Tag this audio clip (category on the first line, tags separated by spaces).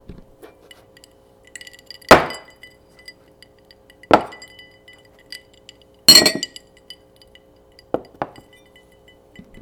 Sound effects > Objects / House appliances
clink counter glass glasses ice